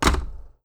Sound effects > Objects / House appliances
COMTelph-Blue Snowball Microphone Nick Talk Blaster-Telephone, Receiver, Hang Up 05 Nicholas Judy TDC
A telephone receiver being hung up.
Blue-brand, Blue-Snowball, foley, hang-up, receiver, telephone